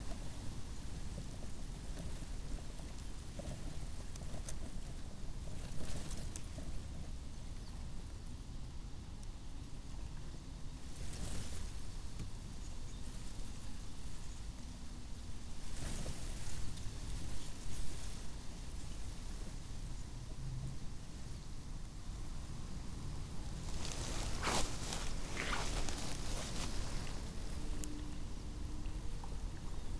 Soundscapes > Nature
Reed & Moped
unedited, reed, wind, raw, field-recording